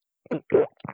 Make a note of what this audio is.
Sound effects > Human sounds and actions
A simple swallow sound
throat, gulp